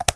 Sound effects > Objects / House appliances
Pill Bottle Cap Close 2
Pill Bottle Sounds
open, close, pills, medicine, closing, opening, pop, drugs, shake, shaking